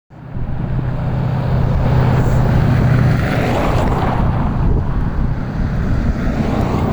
Sound effects > Vehicles
Outdoor recording of a passing car on Malminkaari Road in Helsinki. Captured with a OnePlus 8 Pro using the built‑in microphone.
car, vehicle